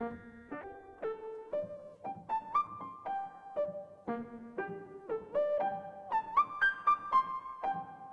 Music > Solo instrument
FANTASTIC MR FOX 118BPM (prod.